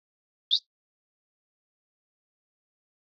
Sound effects > Animals
Bird Tweet 2
Bird sound made with my voice.
bird, chirp, tweet